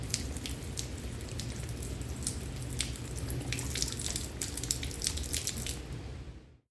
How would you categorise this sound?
Sound effects > Natural elements and explosions